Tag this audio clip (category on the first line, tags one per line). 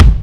Instrument samples > Percussion
attack
bass
bass-drum
bassdrum
beat
death-metal
drum
drums
fat-drum
fatdrum
fat-kick
fatkick
forcekick
groovy
headsound
headwave
hit
kick
mainkick
metal
natural
Pearl
percussion
percussive
pop
rhythm
rock
thrash
thrash-metal
trigger